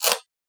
Sound effects > Objects / House appliances
Chains Drop 2 SFX
Dropping jewellery chains and necklaces in various thicknesses, recorded with an AKG C414 XLII microphone.
Chain, Jewellery, Necklace